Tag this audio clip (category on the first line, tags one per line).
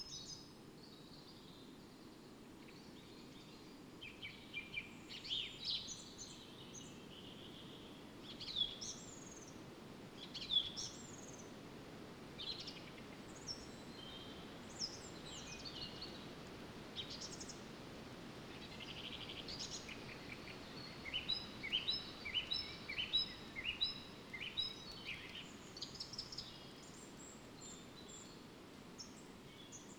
Soundscapes > Nature
natural-soundscape
field-recording
raspberry-pi
soundscape
Dendrophone
alice-holt-forest
data-to-sound
phenological-recording
sound-installation
nature